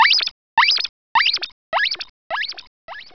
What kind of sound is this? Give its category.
Sound effects > Animals